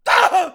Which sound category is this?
Speech > Solo speech